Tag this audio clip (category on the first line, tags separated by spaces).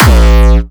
Instrument samples > Percussion
Jumpstyle Oldschool Kick Hardstyle